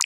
Instrument samples > Percussion
This snap synthed with phaseplant granular, and used samples from bandlab's ''FO-REAL-BEATZ-TRENCH-BEATS'' sample pack. Processed with multiple ''Khs phaser'', and Vocodex, ZL EQ, Fruity Limiter. Enjoy your ''water'' music day!